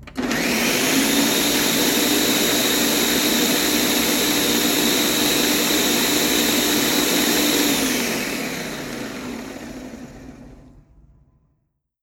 Sound effects > Objects / House appliances
MACHAppl-Samsung Galaxy Smartphone, CU Blender, On, Blend at Medium Speed, Off Nicholas Judy TDC
A blender turning on, blending at medium speed and turning off.
turn-on, blender, medium-speed, turn-off, blend, Phone-recording